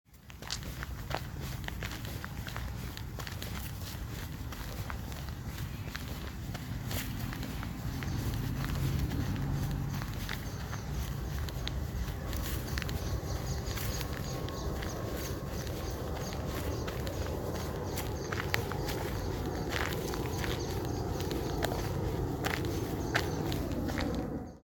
Sound effects > Human sounds and actions
Walking outside 01
I took a walk in an empty park along a thin trail.
crunch, feet, foley, foot, footstep, foot-steps, footsteps, gravel, outside, step, steps, walk, walking